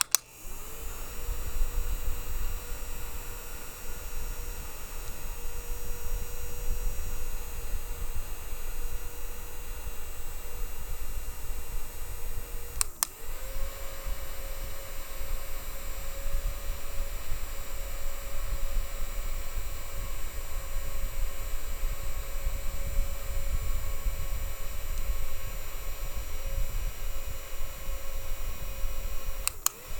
Sound effects > Other mechanisms, engines, machines
MACHFan-Blue Snowball Microphone, MCU Handheld, Turn On, Run at 3 Speeds, Off Nicholas Judy TDC
A handheld fan turning on, running and turning off in three speeds.
Blue-Snowball, turn-on, handheld, run, turn-off, fan, high-speed, low-speed, Blue-brand, medium-speed